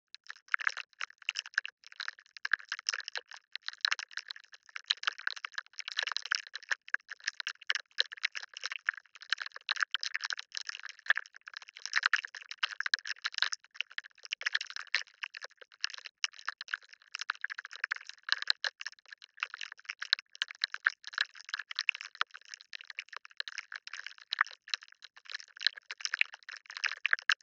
Sound effects > Electronic / Design
All samples used from: TOUCH-LOOPS-VINTAGE-DRUM-KIT-BANDLAB. Processed with KHS Filter Table, KHS Convolver, Vocodex, ZL EQ and Fruity Limiter.